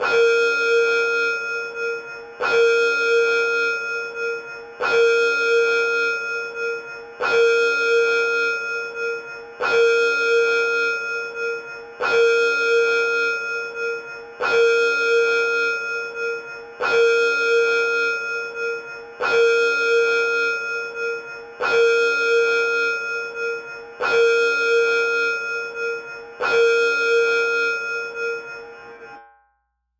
Sound effects > Other mechanisms, engines, machines
Low fidelity retro video game building alarm
Low fidelity video game style alarm siren sound. Reminds me of the one in the Foundry level of THPS3. Looping 100bpm alarm sound with some tape echo. Made in FL Studio using UAD Tape Recorder and Maximus.